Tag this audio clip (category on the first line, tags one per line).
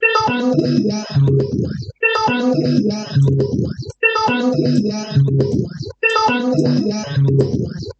Sound effects > Electronic / Design
content-creator
dark-design
dark-soundscapes
dark-techno
drowning
glitchy-rhythm
noise
noise-ambient
PPG-Wave
rhythm
science-fiction
sci-fi
scifi
sound-design
vst
weird-rhythm
wonky